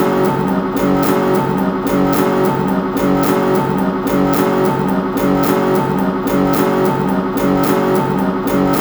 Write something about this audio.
Instrument samples > Synths / Electronic
This 218bpm Synth Loop is good for composing Industrial/Electronic/Ambient songs or using as soundtrack to a sci-fi/suspense/horror indie game or short film.
Alien, Ambient, Drum, Industrial, Loopable, Samples, Underground, Weird